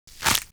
Sound effects > Human sounds and actions
Bone breaking
Here is a sound for your fight scenes—a sound to leave a crack in the character's body.
gore, flesh, bone, blood